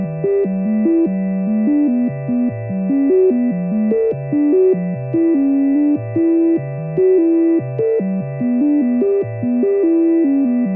Soundscapes > Synthetic / Artificial
April 4th 12 o‘clock
Noon forest sonification from April 4th, 2025 (00:00), with pitch shaped by air temperature and CO₂, rhythm from sunlight, vibrato from radiation, and tonal color from wind and humidity.
Climate, PureData, SensingtheForest, Sonification